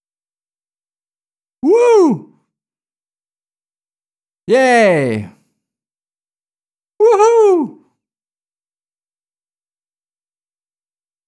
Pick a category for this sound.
Sound effects > Human sounds and actions